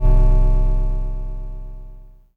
Sound effects > Experimental
Analog Bass, Sweeps, and FX-160
effect, dark, bassy, machine, retro, analog, alien, korg, scifi, pad, sfx, basses, analogue, fx, mechanical, vintage, robot, synth, robotic, complex, snythesizer, weird, oneshot, trippy, electro, sweep, bass, sample, electronic, sci-fi